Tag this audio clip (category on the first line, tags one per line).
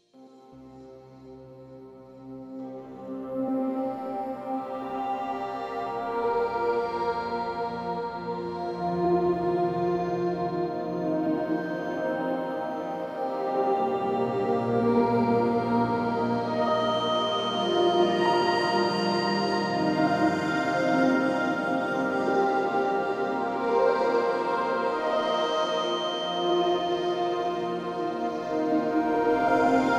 Music > Solo instrument
Synthetic
Alien